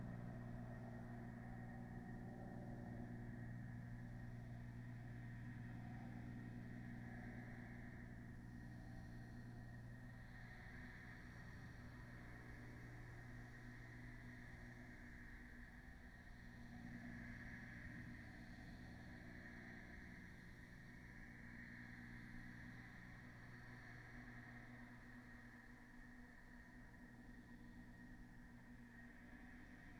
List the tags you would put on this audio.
Soundscapes > Nature
data-to-sound nature phenological-recording soundscape natural-soundscape sound-installation field-recording modified-soundscape weather-data raspberry-pi Dendrophone artistic-intervention alice-holt-forest

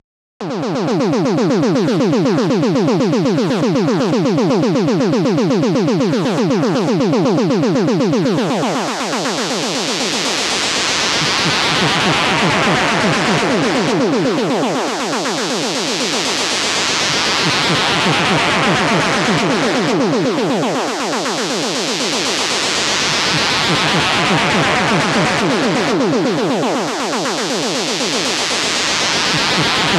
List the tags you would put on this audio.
Soundscapes > Synthetic / Artificial
electronic synth buchla

